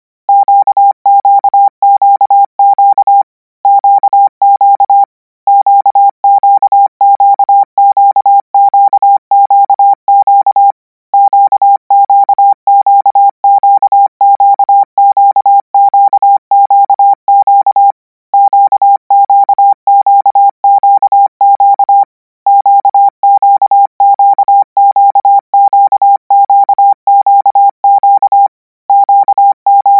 Sound effects > Electronic / Design
Koch 24 Q - 200 N 25WPM 800Hz 90
Practice hear letter 'Q' use Koch method (practice each letter, symbol, letter separate than combine), 200 word random length, 25 word/minute, 800 Hz, 90% volume.
radio, codigo, code, letters